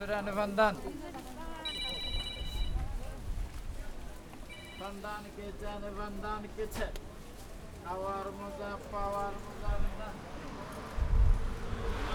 Soundscapes > Urban
LNDN SOUNDS 036
Recordings from near a street market stall in a mostly Indian / Bangladeshi neighborhood of London. Quite noisy and chaotic. Unprocessed sound, captured with a Zoom H6
bustling, city, london, market, neighbourhood